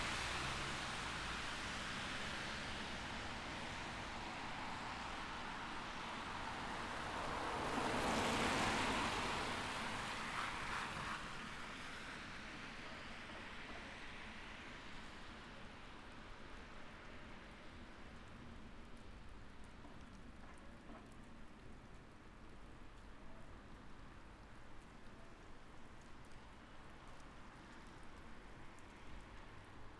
Soundscapes > Urban
VEHBy Cars and busses passing on rain-soaked asphalt, intersection in Karlskrona, Sweden
Recorded 14:16 09/05/25 The road is quite wet even though it’s not raining as much. It’s a normal town street with apartment buildings on either side. To the right is the four-way intersection but cars mostly pass from the right or left across it. The buses are especially loud. Very little other noise, sometimes pedestrians or a bicyclist. Zoom H5 recorder, track length cut otherwise unedited.
Passing, Driving, Vehicles, Soaked, People, Sweden, Busses, Karlskrona, Rain, Asphalt, Road, Wet, Field-Recording, Town, Cars, Daytime, Street